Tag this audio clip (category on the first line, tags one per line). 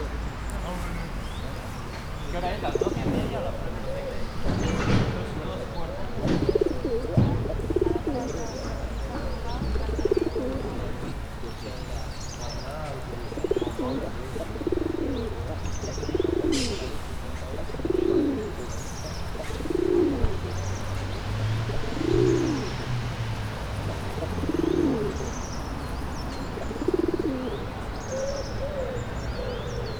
Soundscapes > Urban
Jardins
Montbau
Pigeons
Pleasant
Traffic